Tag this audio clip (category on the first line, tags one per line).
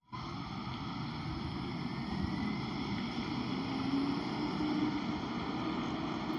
Sound effects > Vehicles
vehicle
drive
tram